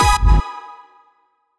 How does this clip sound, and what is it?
Instrument samples > Percussion
ColorKick 1 #Gmin
Toc synthed with vital synthsiser. All used sample from the FLstudio original sample pack. So you don't need to worry about any problem with this sound.
colorstyle, hardstyle, kick, rawstyle